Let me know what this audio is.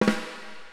Music > Solo percussion
Snare Processed - Oneshot 99 - 14 by 6.5 inch Brass Ludwig
kit, hit, beat, perc, snaredrum, drum, flam, sfx, snare, percussion, snares, oneshot, drums, reverb, snareroll, ludwig, rimshots, acoustic, fx, roll, crack, rim, processed, brass, realdrums, drumkit, rimshot, realdrum, hits